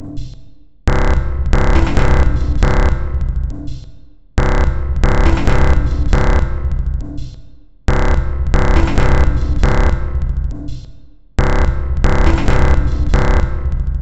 Instrument samples > Percussion
This 137bpm Drum Loop is good for composing Industrial/Electronic/Ambient songs or using as soundtrack to a sci-fi/suspense/horror indie game or short film.
Drum, Samples, Ambient, Industrial, Loopable, Underground, Soundtrack, Dark, Loop, Alien, Packs, Weird